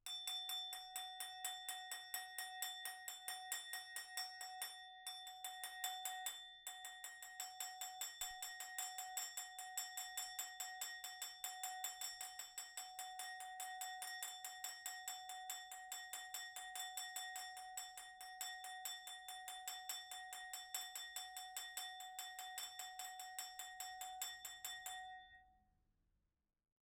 Sound effects > Other
Glass applause 20
applause, cling, clinging, FR-AV2, glass, individual, indoor, NT5, person, Rode, single, solo-crowd, stemware, Tascam, wine-glass, XY